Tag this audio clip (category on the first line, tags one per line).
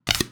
Sound effects > Objects / House appliances
blowing
blow
shot
foley